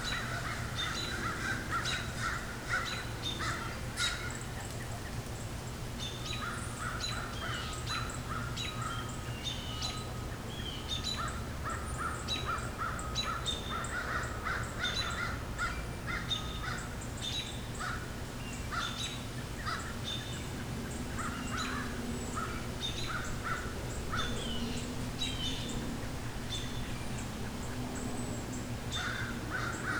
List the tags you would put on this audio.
Soundscapes > Urban
birdsong neighborhood residential suburbia summer

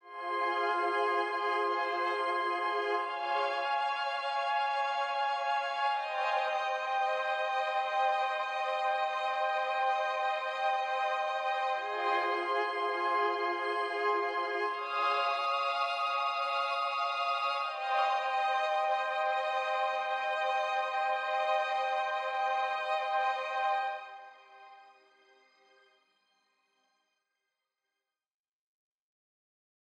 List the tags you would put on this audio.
Music > Solo instrument
1lovewav dreamy electronic loop processed sample synth

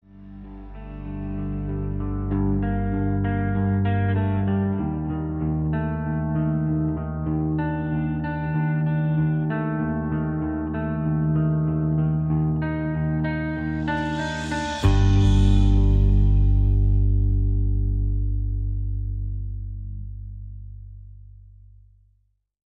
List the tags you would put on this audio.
Music > Other
BM electric guitar sample

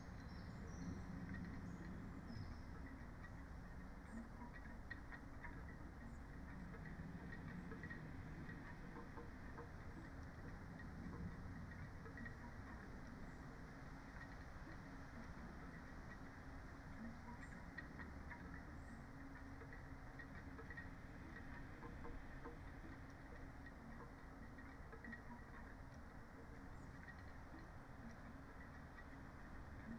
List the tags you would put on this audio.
Soundscapes > Nature
Dendrophone nature raspberry-pi sound-installation soundscape

